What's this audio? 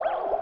Soundscapes > Synthetic / Artificial
LFO Birdsong 60
Birdsong, LFO, massive